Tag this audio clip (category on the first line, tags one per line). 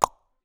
Sound effects > Human sounds and actions
clock
click
tick